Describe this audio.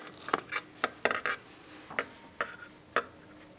Sound effects > Objects / House appliances
Scissor Foley Snips and Cuts 7
tools, scrape, foley, sfx, scissor, slice, cut, household, snip, fx, metal, perc, scissors